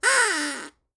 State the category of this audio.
Sound effects > Animals